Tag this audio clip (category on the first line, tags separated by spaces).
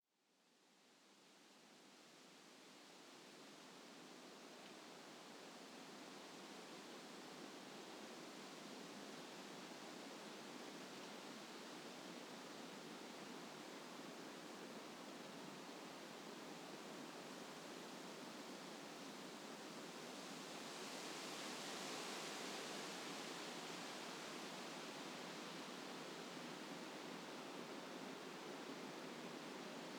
Soundscapes > Other
gusts howling wind wind-chimes